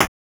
Instrument samples > Percussion
8 bit-Noise Percussion5
8-bit, FX, game, percussion